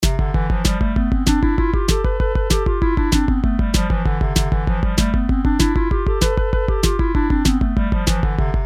Music > Other
Very fast and good for exciting music Needs a backbeat tho